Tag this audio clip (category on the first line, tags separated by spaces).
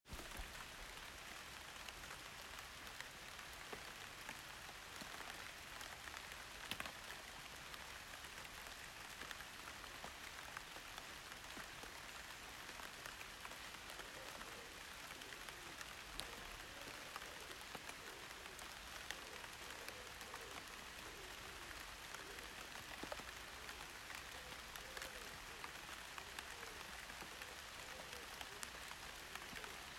Soundscapes > Nature
field-recording gentlerain leaves nature rain